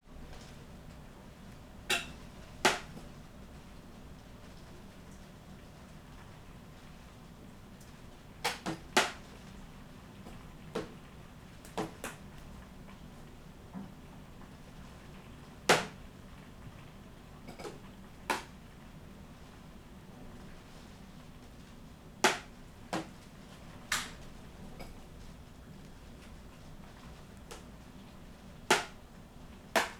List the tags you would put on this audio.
Soundscapes > Indoors
weather
field-recording
raining
water
drops
wet
raindrops
indoor
rain

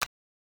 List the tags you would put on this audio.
Sound effects > Electronic / Design
effect
Interface

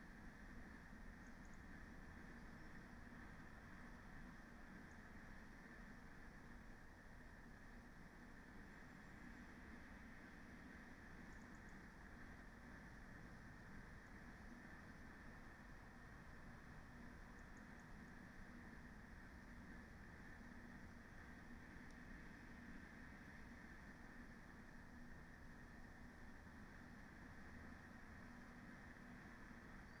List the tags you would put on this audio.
Soundscapes > Nature
raspberry-pi
artistic-intervention
weather-data
phenological-recording
alice-holt-forest
sound-installation
natural-soundscape
soundscape
Dendrophone
nature
field-recording
data-to-sound
modified-soundscape

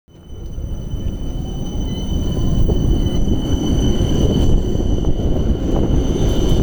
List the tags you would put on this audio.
Sound effects > Vehicles
vehicle
tram
rail

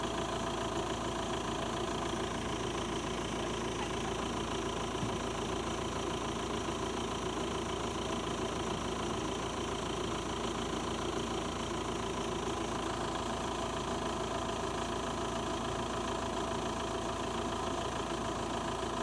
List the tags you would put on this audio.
Sound effects > Vehicles
car
motor

